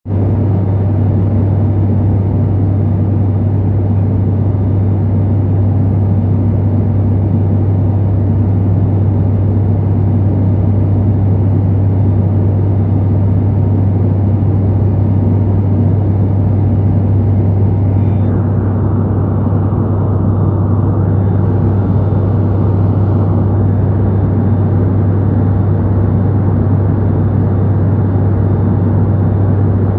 Sound effects > Vehicles
ATR Engine noise inside the cabin
Noise of a propeller engine of an ATR airplane recorded mid flight from inside the passenger cabin.